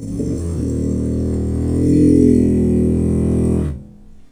Sound effects > Experimental
Creature Monster Alien Vocal FX-57
Alien, Animal, boss, Creature, Deep, demon, devil, Echo, evil, Fantasy, Frightening, fx, gamedesign, Groan, Growl, gutteral, Monster, Monstrous, Ominous, Otherworldly, Reverberating, scary, sfx, Snarl, Snarling, Sound, Sounddesign, visceral, Vocal, Vox